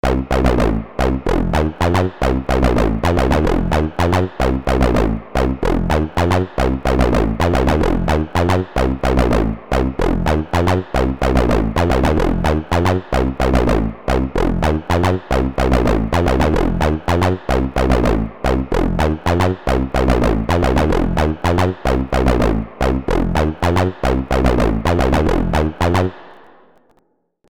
Instrument samples > Synths / Electronic
Ableton Live.Simple VST.Fury-800......Synth 110 bpm Free Music Slap House Dance EDM Loop Electro Clap Drums Kick Drum Snare Bass Dance Club Psytrance Drumroll Trance Sample .